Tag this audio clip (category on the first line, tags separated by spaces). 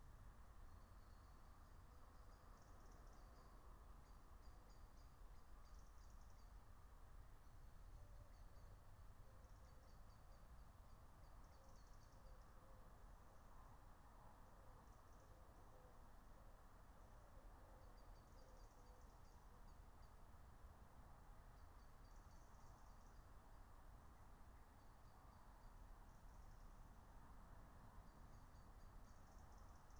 Soundscapes > Nature
nature field-recording natural-soundscape alice-holt-forest soundscape phenological-recording meadow raspberry-pi